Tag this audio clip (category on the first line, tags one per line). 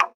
Sound effects > Electronic / Design
game
interface